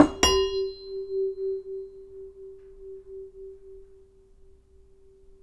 Sound effects > Other mechanisms, engines, machines
metal shop foley -059
knock, bang, rustle, foley, shop, pop, little, boom, metal, crackle, sfx, bop, perc, sound, wood, percussion, strike, tools, tink, bam, oneshot, fx, thud